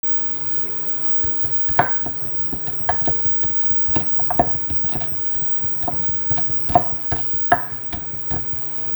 Sound effects > Other
This is the sound of a beginner chopping vegetables on a wooden cutting board. The chopping is slightly uneven and slow, with natural pauses between cuts. Recorded indoors in a quiet kitchen. Useful for cooking scenes, household ambience, or realistic sound effects.